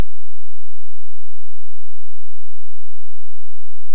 Sound effects > Experimental
Split color wavefrom 2
Warning LOUD. Trying to split the color of the waveform preview horizontally, top and bottom having a different colors. By using some DC offset (made with the "Even harmonics" distortion on Audacity. First test was with a mix of two frequencies, each with a DC offset on opposing phase. Second test was just a DC offset of one frequency (it worked, transparency on one side, colour on the other) Third test I re-tried two frequencies, this time I added a square wave tremolo on each. Idea was the DC offset of each would apply a color to top and bottom. And the Tremolo would rapidly switch between each colour/side. Theoretically resulting in a cycle of top blue, bottom red. Then I was hoping on a long sound, it would compress the waveform so that cycling of colors would no longer be visible and just appear as one. Which it did, but not Top blue bottom red, just a mix of both resulting in a yellow ish waveform.
Sound-to-image, color, DC-Offset, Preview, freesound20